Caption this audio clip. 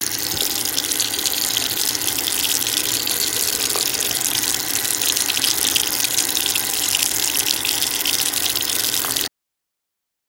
Sound effects > Objects / House appliances
bacon cooking
cooking bacon on the skillet.
bacon, cook, food, skillet